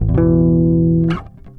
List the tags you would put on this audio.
Instrument samples > String
electric
bass
loop
funk
fx
riffs
blues
mellow
loops
charvel
plucked
pluck
oneshots
slide
rock

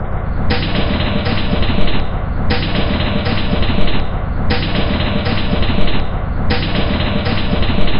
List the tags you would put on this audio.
Instrument samples > Percussion
Soundtrack
Packs
Loopable
Loop
Drum
Dark
Alien
Weird
Industrial
Ambient
Underground
Samples

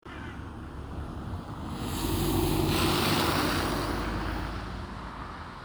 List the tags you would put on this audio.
Sound effects > Vehicles
vehicle
engine

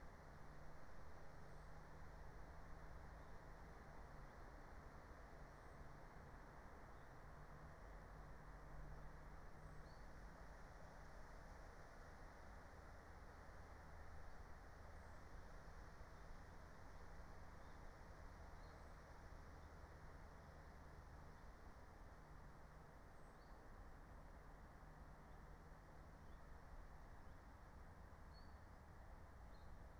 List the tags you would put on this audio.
Soundscapes > Nature
alice-holt-forest
meadow
soundscape
raspberry-pi
nature
phenological-recording
field-recording
natural-soundscape

